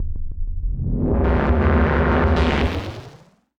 Sound effects > Experimental
Analog Bass, Sweeps, and FX-132
electronic, dark, weird, sweep, sfx, electro, analog, snythesizer, analogue, machine, oneshot, bassy, bass, scifi, korg, synth, alien, robot, robotic, pad, effect, sci-fi, retro, basses, complex, sample, vintage, mechanical, trippy